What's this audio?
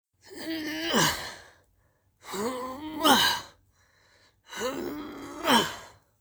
Speech > Other
A sound effect of the exertion noise made by a human male when either trying to push or drag a heavy object. May uses i like to maybe picture maybe the Greek myth of the punishment of Sisyphus made to push a heavy boulder up the hill. Also could be used for someone dragging a heavy object e.g a body lol!. Also could be used in lots of games like a plat former where you have to push an obstacle out the way. Made by R&B Sound Bites if you ever feel like crediting me ever for any of my sounds you use. Good to use for Indie game making or movie making. This will help me know what you like and what to work on. Get Creative!